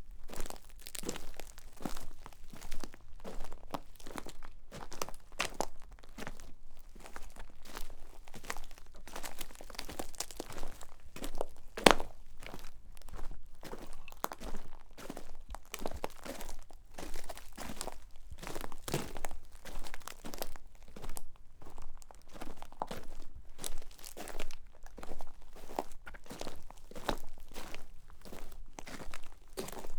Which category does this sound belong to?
Sound effects > Human sounds and actions